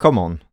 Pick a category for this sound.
Speech > Solo speech